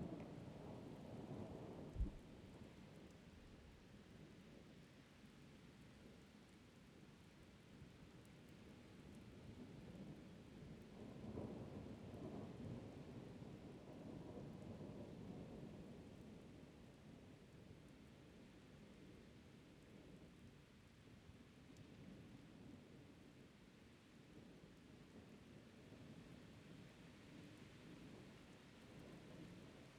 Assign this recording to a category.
Sound effects > Natural elements and explosions